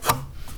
Sound effects > Other mechanisms, engines, machines
Woodshop Foley-032
bam, bang, boom, bop, crackle, foley, fx, knock, little, metal, oneshot, perc, percussion, pop, rustle, sfx, shop, sound, strike, thud, tink, tools, wood